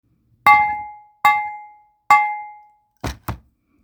Sound effects > Objects / House appliances
A strike of a pot to produce a ringing sound. Not breaking.